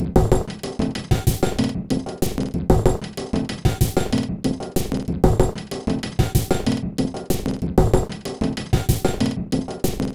Instrument samples > Percussion
This 189bpm Drum Loop is good for composing Industrial/Electronic/Ambient songs or using as soundtrack to a sci-fi/suspense/horror indie game or short film.
Dark, Loop, Underground, Industrial, Packs, Ambient, Loopable, Weird, Drum, Samples, Soundtrack, Alien